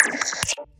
Sound effects > Experimental
Glitch Percs 15 sweetsi
fx, perc, glitchy, whizz, sfx, impacts, experimental, otherworldy, idm, hiphop, alien, snap